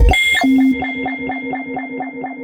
Instrument samples > Synths / Electronic
CVLT BASS 9
bass, bassdrop, clear, drops, lfo, low, lowend, stabs, sub, subbass, subs, subwoofer, synth, synthbass, wavetable, wobble